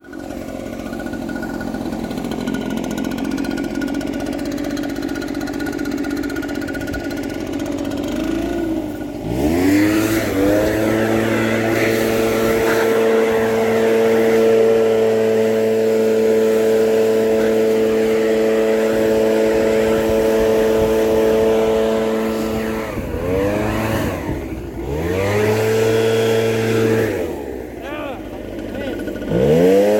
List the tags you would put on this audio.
Sound effects > Other mechanisms, engines, machines
air-blower away fade Phone-recording run start

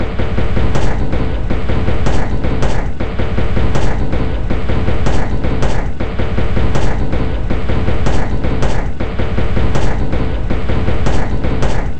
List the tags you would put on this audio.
Instrument samples > Percussion
Loopable Industrial Alien Weird Drum Ambient Underground Soundtrack Loop Samples Dark Packs

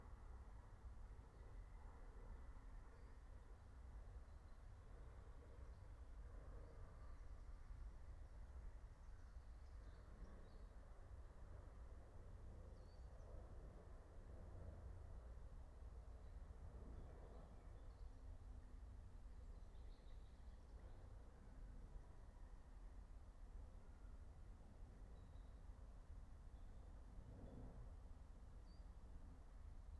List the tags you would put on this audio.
Soundscapes > Nature
alice-holt-forest field-recording natural-soundscape nature phenological-recording raspberry-pi

